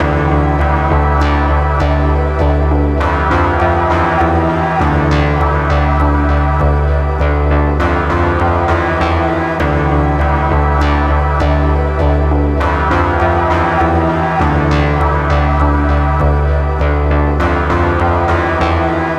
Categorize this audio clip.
Music > Solo instrument